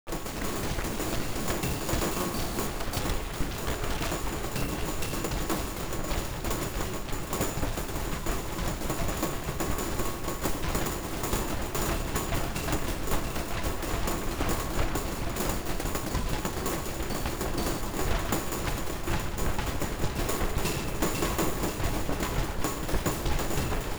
Soundscapes > Synthetic / Artificial
Grain Balls 2
effects, electronic, experimental, free, glitch, granulator, noise, packs, royalty, sample, samples, sfx, sound, soundscapes